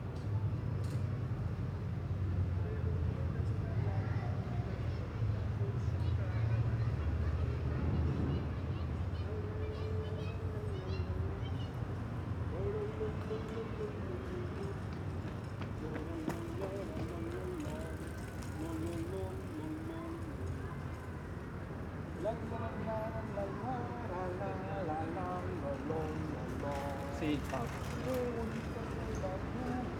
Soundscapes > Urban
Amb city street with people and traffic
Afternoon ambient street. People talking, cars and bycicles passing by.